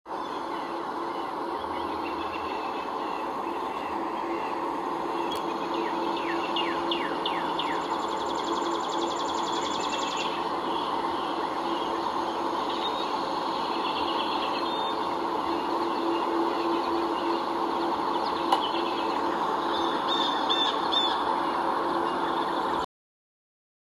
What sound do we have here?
Sound effects > Animals
birds chirping in the morning.